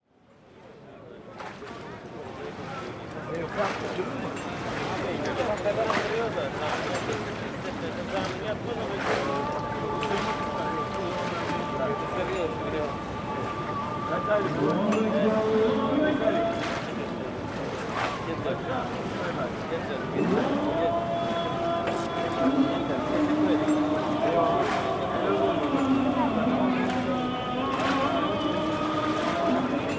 Soundscapes > Urban
Night muslim prayer by the Bosporus in Istanbul
04/08/25 - Istanbul, Bosporus Night muslim prayer recorded on the banks of Bosporus. Loud ship in the middle of recording. Chatting in the background Zoom H2N
boat, istanbul, muslim, night, prayer, water